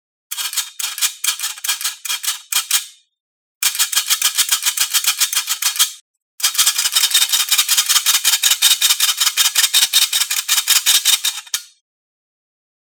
Sound effects > Objects / House appliances
powdered-sugar-sifting
Powdered sugar being sifted with a sifter. Recorded with Zoom H6 and SGH-6 Shotgun mic capsule.